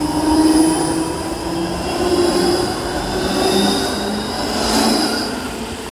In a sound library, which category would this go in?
Sound effects > Vehicles